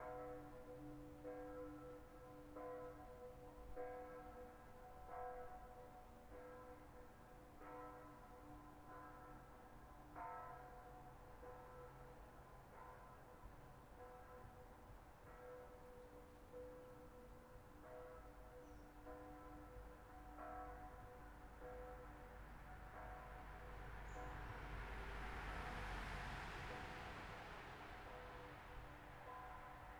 Soundscapes > Nature
Distant Church Bells with Nature/Urban Ambiance
Ambient recording capturing distant church bells ringing softly across a natural soundscape. Recorded on Zoom H4n Pro (stock mics)
rural, church, urban, ambiance, nature, countryside, cars, calm, distant, morning, bells